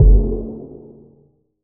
Instrument samples > Percussion
2 kHz low pass crash
THIS IS A CRASH TIMBRE FOR SOUNDBUILDING!
2-kHz, 2kHz, China, clang, clash, crash, crashbuilding, crunch, cymbal, drumbuilding, high-pass, Meinl, metal, metallic, Paiste, Sabian, sinocrash, sinocymbal, smash, Soultone, soundbuilding, splash, spock, Stagg, timbre, trigger, Zildjian, Zultan